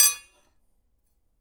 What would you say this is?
Sound effects > Other mechanisms, engines, machines
metal shop foley -018
bam, bang, boom, bop, crackle, foley, fx, knock, little, metal, oneshot, perc, percussion, pop, rustle, sfx, shop, sound, strike, thud, tink, tools, wood